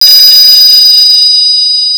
Sound effects > Objects / House appliances
Made in boscaceoil
Ringing, Telephone, Phone